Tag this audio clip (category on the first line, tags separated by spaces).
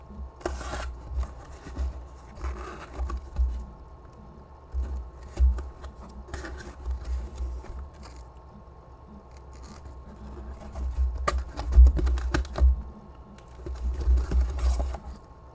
Sound effects > Objects / House appliances
Blue-brand Blue-Snowball box cardboard close fast flap foley lift open slow